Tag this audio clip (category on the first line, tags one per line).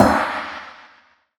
Instrument samples > Percussion
artificial
brass
bronze
China
contrived
crash
crashgong
cymbals
drum
drums
fake
fakery
gong
gongcrash
Istanbul
low-pitched
Meinl
metal
metallic
Sabian
sinocymbal
smash
Soultone
Stagg
synthetic
unnatural
Zildjian
Zultan